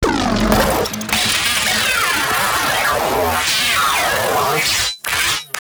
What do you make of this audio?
Sound effects > Electronic / Design
Optical Theremin 6 Osc Destroyed
Digital, Sweep, FX, Glitch, Noise, Synth, Dub, Theremins, Otherworldly, Sci-fi, Spacey, Robotic, DIY, SFX, Analog, Alien, Experimental, Bass, noisey, Electronic, Robot, Theremin, Handmadeelectronic, Infiltrator, Optical, Glitchy, Scifi, Instrument, Trippy, Electro